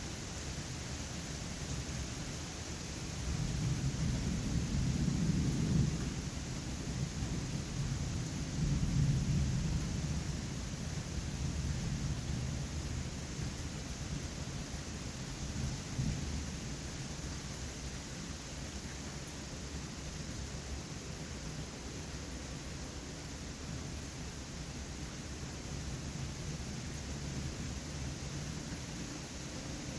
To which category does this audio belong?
Soundscapes > Nature